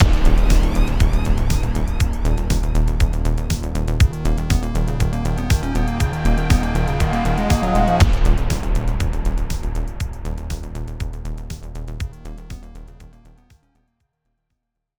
Music > Multiple instruments
This is a demo of a longer piece (the link to which I'll upload soon). Simply write "F.L.O.P. To The Rescue! I'd appreciate if you'd send me a link to your project when it's done. I love seeing how people use my work! I wrote this for a game called "F.L.O.P." produced for the 2025 GMTK Gamejam with the All You Need team.